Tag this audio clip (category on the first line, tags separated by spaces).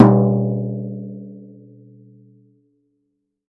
Music > Solo instrument
Crash Kit Perc Ride Sabian